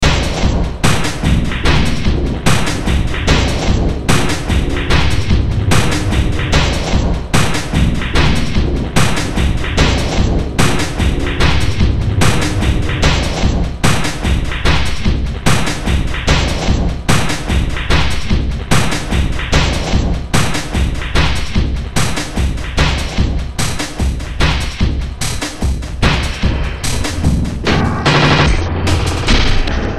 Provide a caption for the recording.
Music > Multiple instruments
Demo Track #3254 (Industraumatic)
Ambient, Noise, Underground, Industrial, Sci-fi, Horror